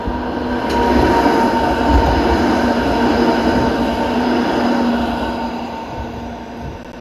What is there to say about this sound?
Soundscapes > Urban
ratikka15 copy
The tram driving by was recorded In Tampere, Hervanta. The sound file contains a sound of tram driving by. I used an Iphone 14 to record this sound. It can be used for sound processing applications and projects for example.